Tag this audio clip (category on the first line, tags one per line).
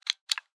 Sound effects > Human sounds and actions
activation
button
click
interface
off
switch
toggle